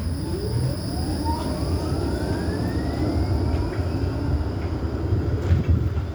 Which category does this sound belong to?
Sound effects > Vehicles